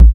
Instrument samples > Percussion
BrazilFunk Kick 31
Synthed with phaseplant only. Well, it actually is a failed botanica bass I made with Vocodex FX. But I try to save it with different way, so I put it in to FL studio sampler to tweak pitch, pogo and boost randomly. Yup, a stupid sample.
BrazilFunk, Distorted, Kick, Sub, Subsive